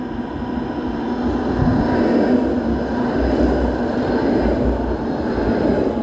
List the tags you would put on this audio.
Sound effects > Vehicles
tram
moderate-speed
embedded-track
passing-by
Tampere

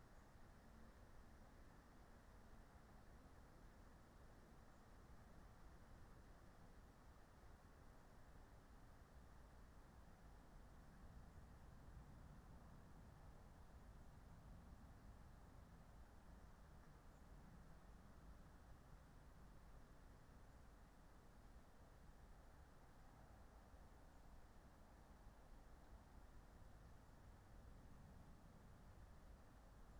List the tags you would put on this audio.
Soundscapes > Nature
modified-soundscape
nature
raspberry-pi
field-recording

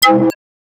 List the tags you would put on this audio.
Sound effects > Electronic / Design
Effect
Game
UI
Pause

